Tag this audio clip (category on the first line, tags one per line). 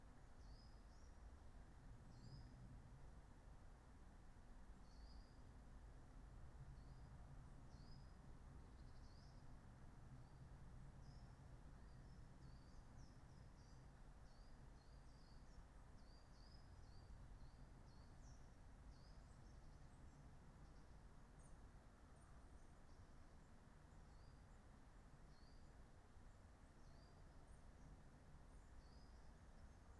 Soundscapes > Nature
artistic-intervention
Dendrophone
raspberry-pi
data-to-sound
sound-installation
phenological-recording
alice-holt-forest
field-recording
modified-soundscape
weather-data
natural-soundscape